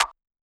Music > Solo percussion
Guitar layered clap
acoustic, techno